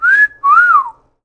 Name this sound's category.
Sound effects > Human sounds and actions